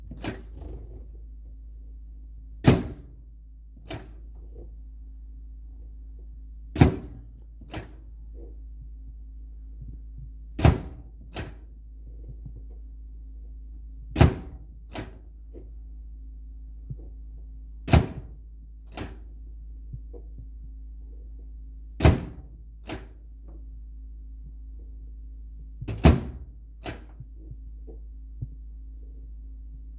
Sound effects > Objects / House appliances
DOORAppl-Samsung Galaxy Smartphone Microwave, Open, Close Nicholas Judy TDC
A microwave door opening and closing.
open, microwave, foley, door, Phone-recording, close